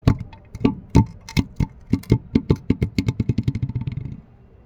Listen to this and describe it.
Soundscapes > Indoors
Heavy earthen pot moving either side